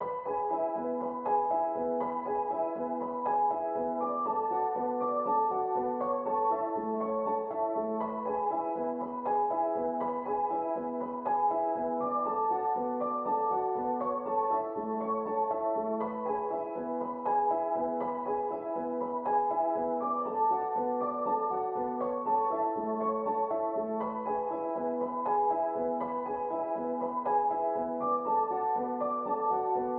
Music > Solo instrument
Piano loops 199 efect octave long loop 120 bpm
simple, samples, reverb, 120bpm, pianomusic, simplesamples, free, loop, music, 120, piano